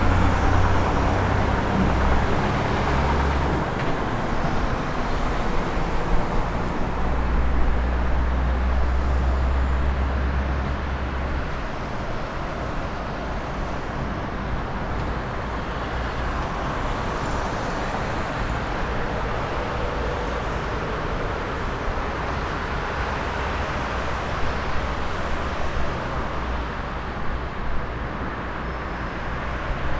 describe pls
Soundscapes > Urban
Busy Street No People - Turin
Daytime recording of a busy street taken from a condominium balcony. Recorded with a Zoom H1essential
car, city, day, stereo-recording, street, street-noise, traffic, truck